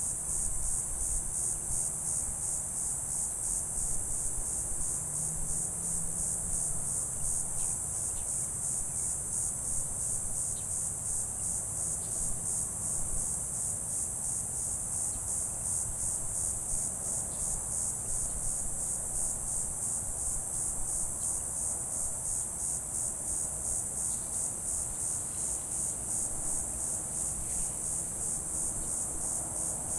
Soundscapes > Nature
Northern Shore of Little Lagoon, Gulf Shores, Alabama 5:45AM. Waves, wind, frogs, crickets, distant traffic